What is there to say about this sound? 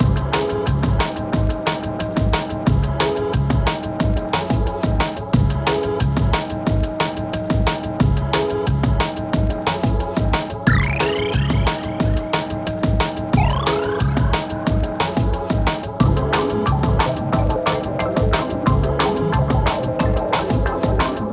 Soundscapes > Synthetic / Artificial
Ghost box is rotten to the core, made in Ableton Live